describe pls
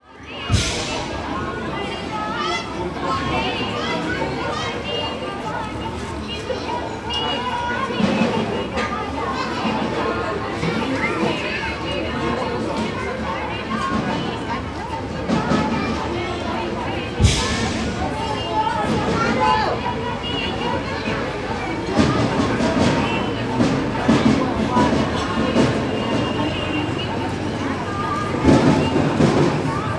Soundscapes > Urban
Loud India (Moon Dance Café)
Temple, Yoga